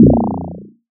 Instrument samples > Synths / Electronic
BWOW 1 Bb
fm-synthesis, additive-synthesis, bass